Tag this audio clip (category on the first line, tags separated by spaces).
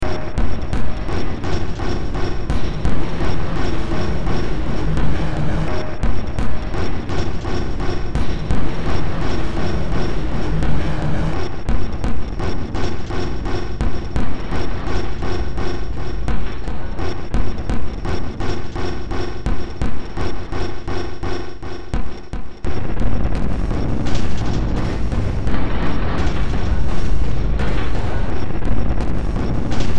Music > Multiple instruments
Noise Horror Cyberpunk Ambient Games Sci-fi Soundtrack Industrial Underground